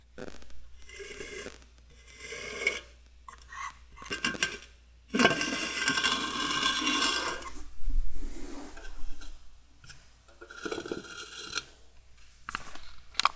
Sound effects > Objects / House appliances
aluminum can foley-027
sfx, fx, metal, household, scrape, water, can, tap, alumminum, foley